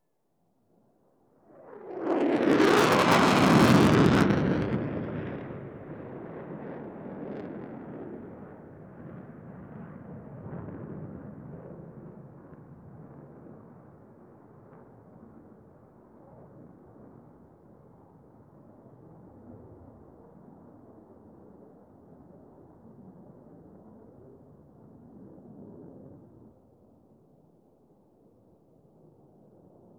Sound effects > Vehicles
F22 Fighter Jet Flyover
F22 flyover recorded at the air force academy.
fighter-jet, plane, F22, aviation, jet, flying, aircraft, aeroplane, Air-force, flyover, fighter, airplane, military